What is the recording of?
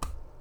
Sound effects > Objects / House appliances
OBJBook-Blue Snowball Microphone Comic Book, Drop to Floor Nicholas Judy TDC
A comic book being dropped to the floor.
foley, floor, comic-book, Blue-brand, Blue-Snowball, drop